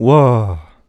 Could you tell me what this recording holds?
Speech > Solo speech
Surprised - Wowahh
Tascam, FR-AV2, Wow, surprised, voice, Voice-acting, singletake